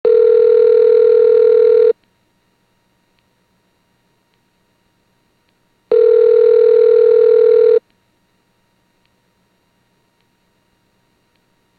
Sound effects > Objects / House appliances
Phone Ringing
Ringing tone on a telephone